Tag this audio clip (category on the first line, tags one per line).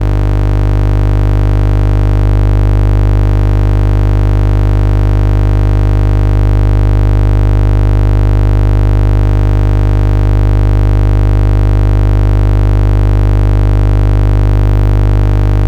Sound effects > Objects / House appliances
electric
electrical
electromagnetic
field
field-recording
induction
magnetic
stove